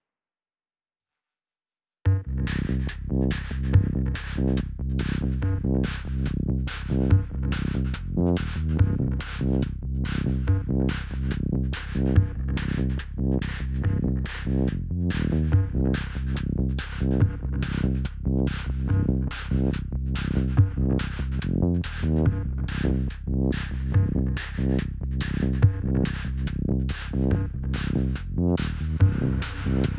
Music > Multiple instruments
clockwise works- BUMP 3
1-shot-improvisation with digital rhythm (Casio PT-31 synth+Zoom 9030 multieffect) and synthbass (Roland Juno-106) played live with no quantization..recorded and mixed with Ableton 11